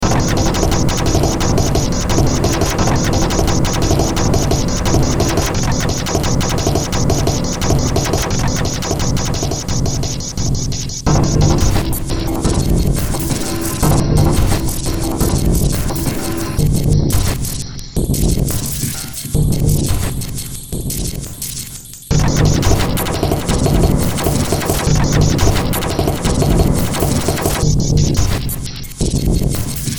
Music > Multiple instruments
Demo Track #4046 (Industraumatic)
Ambient, Cyberpunk, Games, Horror, Industrial, Noise, Sci-fi, Soundtrack, Underground